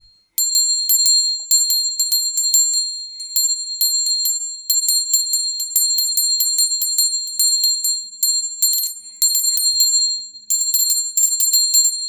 Instrument samples > Other
Me ringing a decorative antique bell in no particular rythm